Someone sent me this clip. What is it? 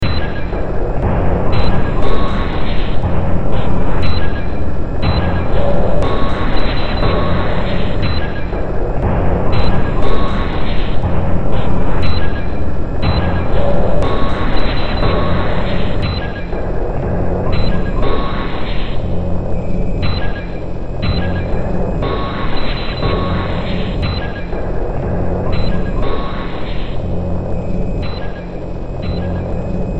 Music > Multiple instruments
Demo Track #3147 (Industraumatic)
Horror, Games, Noise, Ambient, Industrial, Sci-fi, Soundtrack, Underground, Cyberpunk